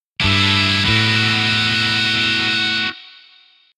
Music > Solo instrument
D and E chords on electric guitar and bass
bass, Electric, Guitar